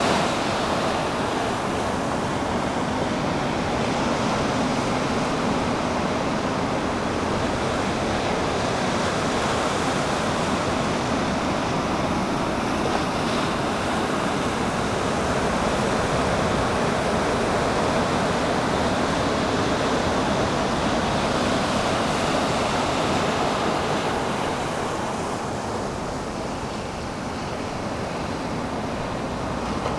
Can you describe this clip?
Soundscapes > Nature
1 min recording of the waves of the pacific ocean.
Ambience, Ambient, Water, Waves